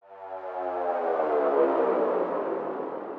Sound effects > Electronic / Design
One-shot FX designed for quick transitions and drops. Sharp, clean, and impactful — perfect for adding tension, accentuating changes, or layering in bass music and psytrance productions. 150 BPM – G minor – heavily processed, so results may vary!
efx, psyhedelic, psytrance, abstract, sound-design, sound, sfx, soundeffect, electric, sci-fi, psy, effect, fx, sounddesign